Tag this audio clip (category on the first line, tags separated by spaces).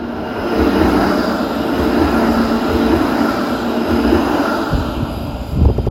Soundscapes > Urban
vehicle traffic tram